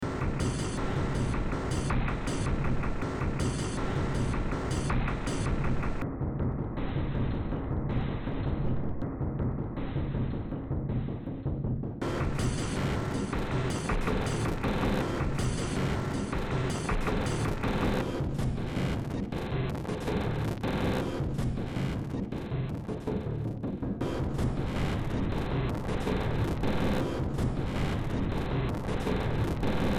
Music > Multiple instruments
Short Track #4040 (Industraumatic)
Industrial, Sci-fi, Ambient, Noise, Cyberpunk, Games, Underground, Soundtrack, Horror